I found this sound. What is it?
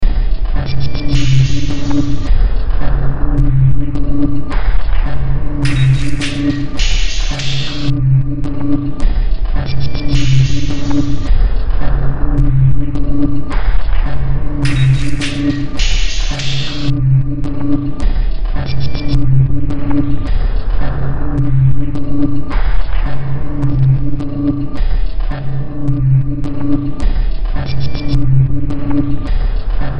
Music > Multiple instruments
Demo Track #3355 (Industraumatic)
Sci-fi, Horror, Games, Soundtrack, Noise, Ambient, Underground, Cyberpunk, Industrial